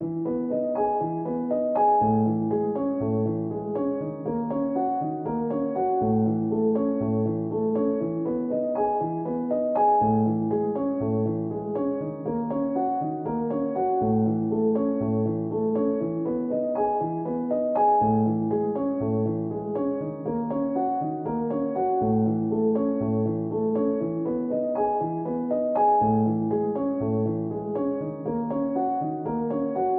Music > Solo instrument
Piano loops 191 octave short loop 120 bpm
piano, music, free, simplesamples, samples